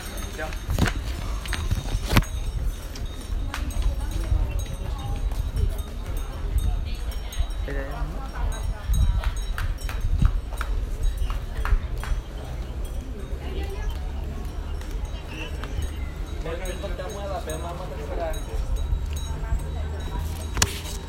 Soundscapes > Urban
Bell Sounds, Temple in Bangkok, Thailand (Feb 23, 2019)
Metal bell sounds recorded in a temple environment in Bangkok, Thailand. Includes surrounding temple ambiance.